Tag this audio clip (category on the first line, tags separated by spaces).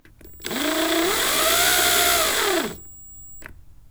Sound effects > Other mechanisms, engines, machines
Drill Foley fx Household Impact Mechanical Metallic Motor Scrape sfx Shop Tool Tools Woodshop Workshop